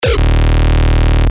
Instrument samples > Percussion
Frechcore kick Testing 1-#D 195bpm
Bass synthed with phaseplant only.
Distorted, Frechore, hardcore, Hardstyle, Kick